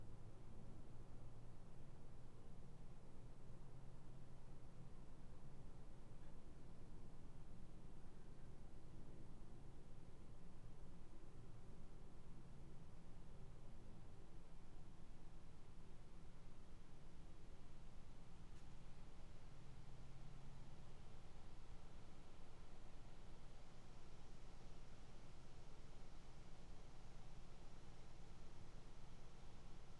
Sound effects > Vehicles
20250612-00h25 Albi Mosquito spray van - H5 XY upper window.
Subject : A truck spraying pyrethroid in the street due to someone catching the dengue fever in the area by an "asian tiger mosquito". Recorded from a first floor (GB) window. Date YMD : 2025 June night if 11-12 starting 00:25 (truck passes in front near 00:57) Location : Albi 81000 Tarn Occitanie France. Hardware : Zoom H5 XY. Weather : Clear sky, no wind. Processing : Trimmed and normalised in Audacity. Notes : There’s a parallel recording using a Zoom starting/finishing exact same time.
Albi, Tarn, street, Zoom-brand, June, spraying, 2025, anti-mosquito, 81000, field-recording, spray, ARS, pyrethroid, night, chemicals, truck, XY, H5, Altopictus